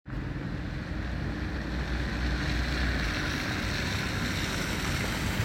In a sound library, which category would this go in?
Sound effects > Vehicles